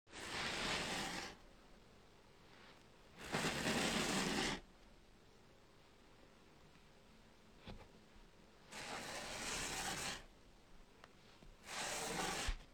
Sound effects > Objects / House appliances
4 strokes on a fabric desk mat
A soft, textured fabric sound similar to a stroke or swipe across a large desk mat. The surface is slightly rough, made of woven polyester or microfiber, and produces a gentle brushing or dragging noise when touched or moved against. The sound feels dry, close, and intimate — like a slow motion of cloth being rubbed or drawn across a desk surface.
polyester, rub, fabric, friction, dry, desk, close-up, microfiber, drag, brushing, soft, scratch, stroke